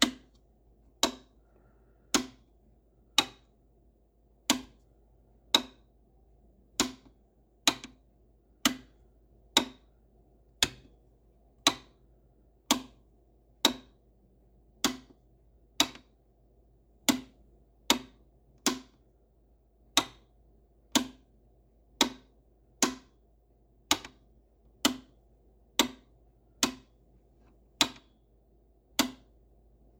Sound effects > Objects / House appliances
MECHSwtch-Samsung Galaxy Smartphone, CU Breville Electric Juicer, Toggle Switch Nicholas Judy TDC
A Breville electric juicer toggle switch.
toggle, Phone-recording, foley, electric, juicer, switch